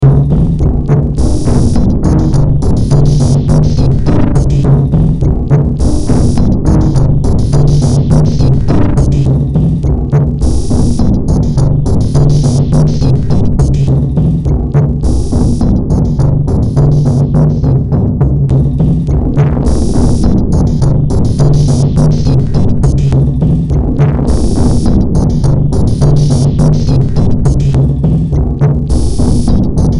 Music > Multiple instruments
Track taken from the Industraumatic Project.